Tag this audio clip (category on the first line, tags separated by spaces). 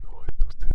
Speech > Processed / Synthetic
ghost whispering whisper creepy